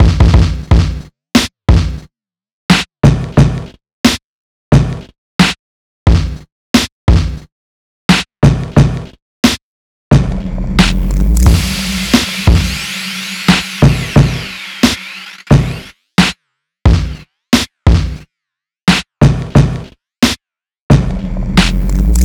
Music > Multiple instruments
89 - Shutter Beat
beat, flanger, fx, gated, hiphopbeat, loop